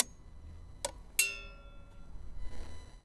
Sound effects > Objects / House appliances
Junkyard Foley and FX Percs (Metal, Clanks, Scrapes, Bangs, Scrap, and Machines) 68
Ambience, Atmosphere, Bang, Clang, Clank, Dump, dumpster, Environment, Foley, FX, garbage, Junk, Metal, Perc, Percussion, rattle, Robot, Robotic, SFX, Smash, tube